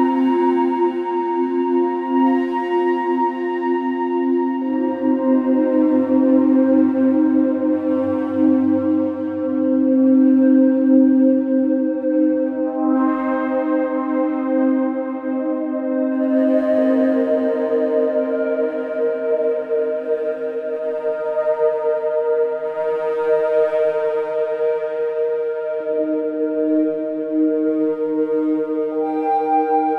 Soundscapes > Synthetic / Artificial
Endless drifting ambient soundscape. To me it sounds celestial, relaxing and meditative. Created with miRack, a bunch of random trigger generators, reverb and echo. Recorded on iPad with AUM.